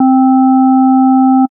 Instrument samples > Synths / Electronic
05. FM-X ODD2 SKIRT1 C3root
MODX, Montage, Yamaha, FM-X